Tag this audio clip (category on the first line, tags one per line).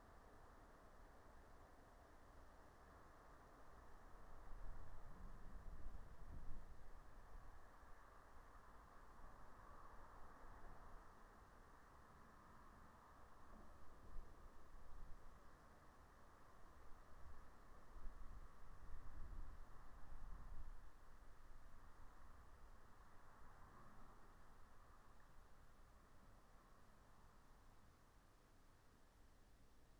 Soundscapes > Nature
phenological-recording
soundscape
alice-holt-forest
meadow
nature
natural-soundscape
raspberry-pi
field-recording